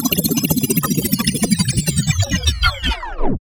Sound effects > Electronic / Design
FX-Downlifter-Glitch Downlifter 3
Then I used Edison to resample it and put it into FLstudio sampler to tweak pogo, pitch and MUL amount.
Downlifter, Downsweep, Effect, FX